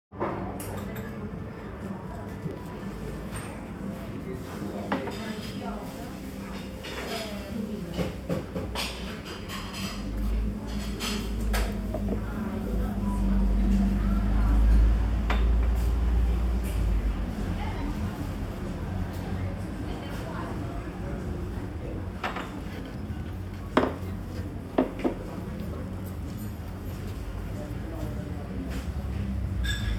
Soundscapes > Urban
Morning in an italian Café, Bologna (Bar Zanarini)